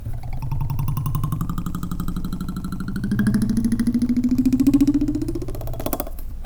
Sound effects > Objects / House appliances
knife and metal beam vibrations clicks dings and sfx-098
Beam, Clang, ding, Foley, FX, Klang, Metal, metallic, Perc, SFX, ting, Trippy, Vibrate, Vibration, Wobble